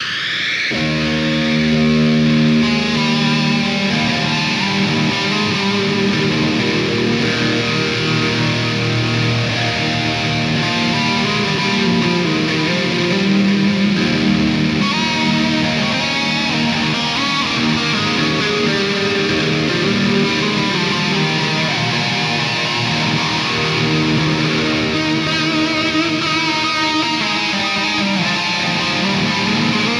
Music > Solo instrument
Electric Guitar solo
Just a solo I did, Idk what you'd use it for but if you need one here you go. If you do use it, let me see please!
Cinematic, Electric, Solo, Reverb, 80s, Metal, Rock, Strings, Shred, Uplifting, Distortion, Halen, Guitar, Distorted, Hair, Emotional, Music, Van, Hero